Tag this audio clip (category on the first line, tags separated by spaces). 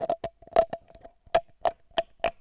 Sound effects > Other
sound microphone scratching